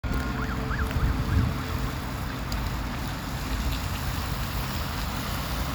Soundscapes > Urban
An electric bus passing the recorder in a roundabout. The sound of the bus tires can be heard along with siren sound of an emergency vehicle in the background Recorded on a Samsung Galaxy A54 5G. The recording was made during a windy and rainy afternoon in Tampere.